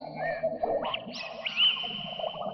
Soundscapes > Synthetic / Artificial
LFO Birdsong 45
LFO, massive, Birdsong